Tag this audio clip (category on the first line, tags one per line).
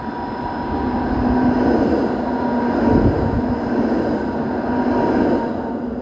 Sound effects > Vehicles
Tampere
embedded-track
passing-by
moderate-speed